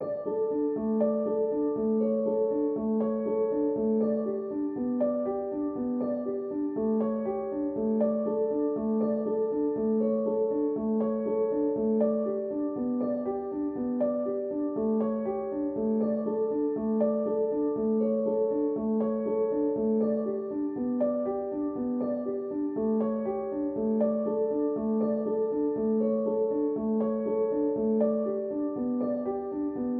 Music > Solo instrument
Piano loops 198 octave down short loop 120 bpm
120bpm, loop, simple